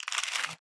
Sound effects > Objects / House appliances
Matchsticks PickMatch 1 Shaker
Picking a matchstick from a box full of them, recorded with an AKG C414 XLII microphone.
matchstick, matchstick-box, rattle